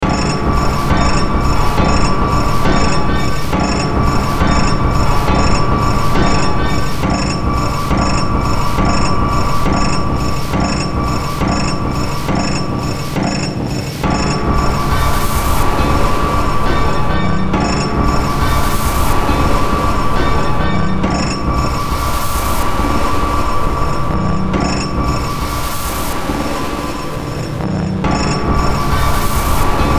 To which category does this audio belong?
Music > Multiple instruments